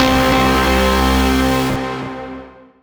Instrument samples > Synths / Electronic
CVLT BASS 86
synthbass, subs, subwoofer, bass, low, wobble, stabs, sub, synth